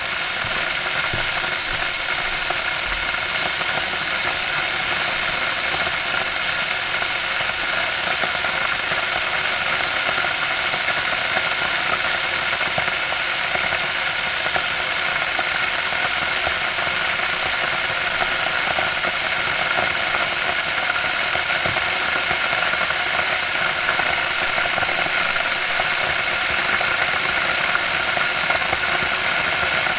Sound effects > Objects / House appliances
Boiling Water 01
A Zoom H4N multitrack recorder was placed next to an electric kettle containing one liter of water. Said kettle was turned on and the water was allowed to boil. This audio file is a recording from the beginning to end of this common household task.